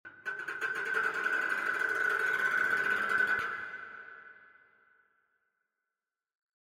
Sound effects > Experimental
Scraping along a metal grid with a fork. With some reverb. Versatile sound, not very specific to any object I can think of.
gear, gears, sliding, weird, experiment, close, scrape, lock, eerie, door, Scraping, treasure, mechanic, open, cold, blinds, mechanism, slide